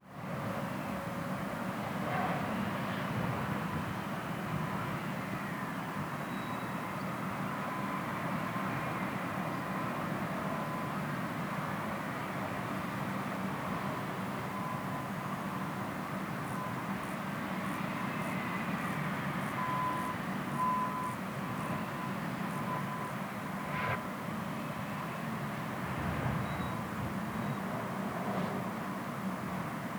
Soundscapes > Urban
Splott - Distant Ice Cream Van Traffic Industry Insects - Splott Beach Costal Path
fieldrecording, splott, wales